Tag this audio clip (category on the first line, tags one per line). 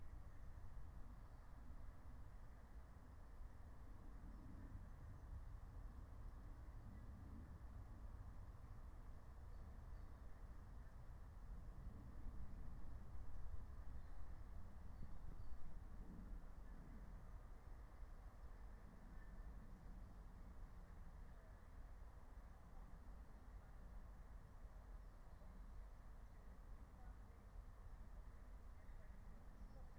Soundscapes > Nature
phenological-recording
alice-holt-forest
soundscape
raspberry-pi
nature